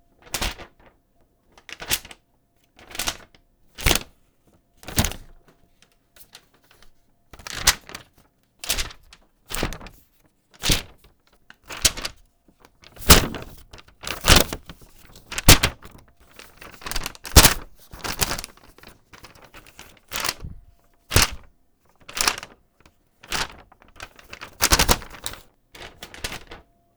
Sound effects > Objects / House appliances
Me flicking out a piece of paper as if to straighten it up Recorded using the microphone on my Valve index